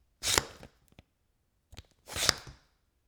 Sound effects > Objects / House appliances
250726 - Vacuum cleaner - Philips PowerPro 7000 series - Extending and retracting brush from handle
7000, aspirateur, cleaner, FR-AV2, Hypercardioid, MKE-600, MKE600, Powerpro, Powerpro-7000-series, Sennheiser, Shotgun-mic, Shotgun-microphone, Single-mic-mono, Tascam, Vacum, vacuum, vacuum-cleaner